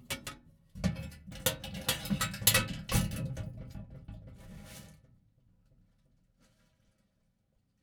Sound effects > Other mechanisms, engines, machines
Subject : Closing a letter box with my microphone inside. You can hear it closing and the key locking it. Date YMD : 2025 June 11 Location : Albi 81000 Tarn Occitanie France. Hardware : Zoom H2n Weather : clear sky Processing : Trimmed in Audacity.